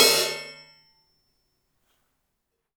Music > Solo instrument
Cymbal Grab Stop Mute-010
Crash, Oneshot, Paiste, FX, Hat, Custom, Ride, Sabian, Metal, Drum, Cymbals, Drums, Kit, GONG, Cymbal, Percussion, Perc